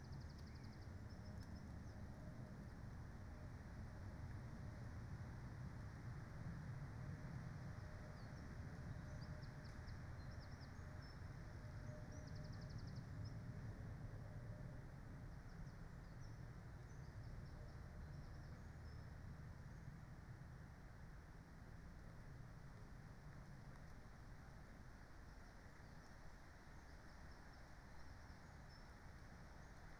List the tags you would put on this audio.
Soundscapes > Nature
field-recording data-to-sound artistic-intervention weather-data raspberry-pi phenological-recording alice-holt-forest soundscape Dendrophone natural-soundscape sound-installation modified-soundscape nature

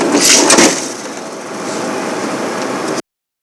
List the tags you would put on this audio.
Sound effects > Objects / House appliances
money coins cash white vintage shut lofi close noise rattle register whitenoise shove

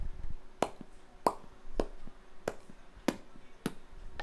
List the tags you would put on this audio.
Sound effects > Human sounds and actions
cracking popping mouth

